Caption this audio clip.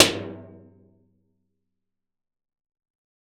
Soundscapes > Other
I&R Tunnel cimetiere Pratgraussal Mid mic n pop 2
Subject : Impulse response for convolution reverb. Date YMD : 2025 August 11 Early morning. Location : Albi 81000 Tarn Occitanie France. Mostly no wind (Said 10km/h, but places I have been were shielded) Processing : Trimmed and normalised in Audacity. Very probably trim in, maybe some trim out.
Balloon, convolution, convolution-reverb, FR-AV2, impulse, Impulse-and-response, impulse-response, IR, NT5-o, NT5o, pop, Rode, Tascam, tunnel